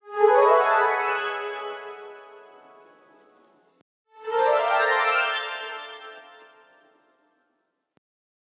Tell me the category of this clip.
Sound effects > Electronic / Design